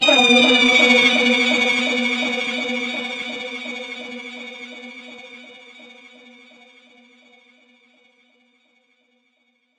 Sound effects > Electronic / Design
Psytrance One Shot 02
goa, goa-trance, goatrance, psy, psytrance, trance